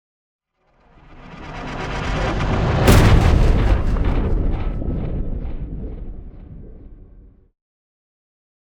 Sound effects > Other
Effects recorded from the field.